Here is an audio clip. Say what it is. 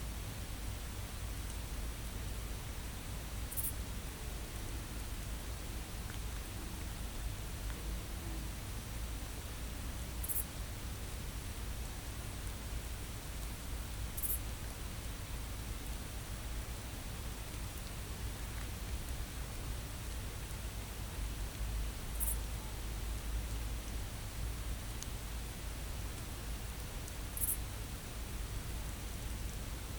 Soundscapes > Nature
20250906 03h00 Gergueil Garden ambience DJI single
Subject : Ambience recording from a garden in Gergueil. Recorded from under a wheel barrow. Date YMD : 2025 September 06 at 03h00 Location : Gergueil 21410 Bourgogne-Franche-Comte Côte-d'Or France Hardware : Dji Mic 3 internal recording. Weather : Processing : Trimmed and normalised in Audacity.